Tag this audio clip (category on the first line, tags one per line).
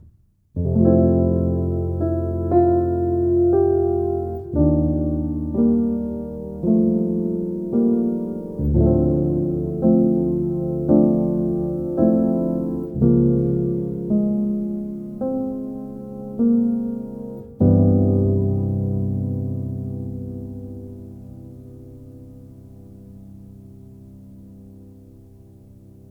Music > Solo instrument
christmas
motif
piano